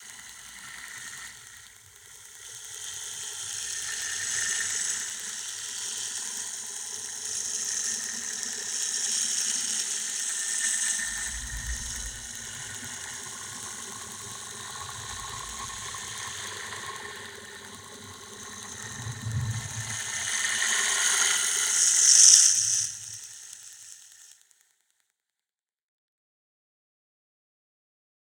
Sound effects > Natural elements and explosions
Enhanced: 467285 xfixy8 water-freezing
Enhancement and/or distortion of 467285. Used for a story to indicate freezing air.